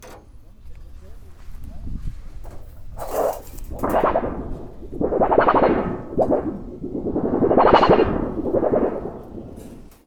Sound effects > Objects / House appliances
Junkyard Foley and FX Percs (Metal, Clanks, Scrapes, Bangs, Scrap, and Machines) 168
tube, dumpster, Bang, SFX, Metal, Robotic, Foley, rubbish, dumping, Machine, waste, rattle, garbage, Junkyard, Smash, trash, Junk, Ambience, Environment, FX, Dump, Clank, Robot, scrape, Clang, Bash, Perc, Metallic, Atmosphere, Percussion